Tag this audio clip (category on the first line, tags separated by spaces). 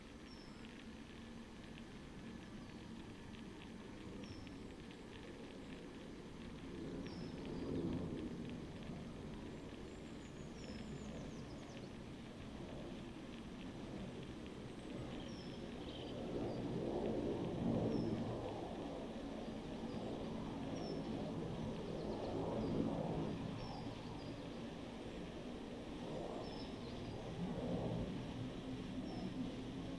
Soundscapes > Nature
data-to-sound soundscape modified-soundscape artistic-intervention sound-installation Dendrophone field-recording weather-data phenological-recording nature natural-soundscape raspberry-pi alice-holt-forest